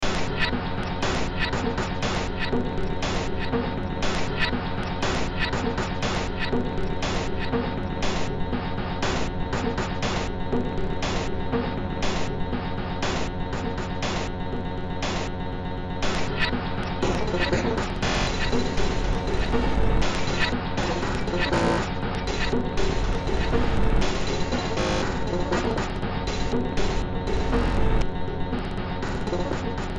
Music > Multiple instruments
Demo Track #3751 (Industraumatic)
Ambient, Cyberpunk, Games, Horror, Industrial, Noise, Sci-fi, Soundtrack, Underground